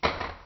Sound effects > Other
Plasticky impact sound effect. Slowed down recording of hitting the space bar on my keyboard. Recorded with my phone.
hit, impact, plastic